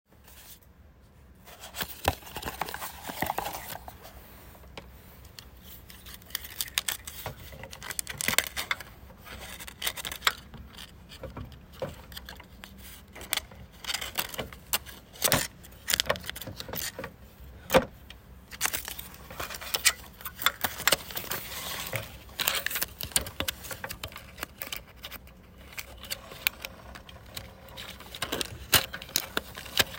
Sound effects > Other mechanisms, engines, machines
All sounds associated with a 35mm plastic microfilm scanner being loaded with microfilm, cranked, fast-forwarded and rewound. Actual research of vintage newspapers at a local library being performed for the recording.
light, reading